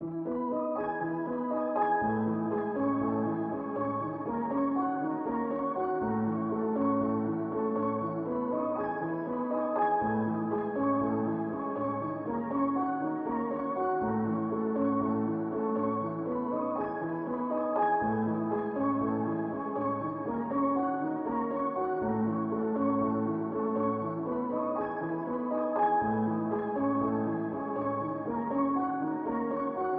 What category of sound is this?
Music > Solo instrument